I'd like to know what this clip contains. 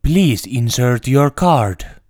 Speech > Solo speech
please insert your card
male, card, man, calm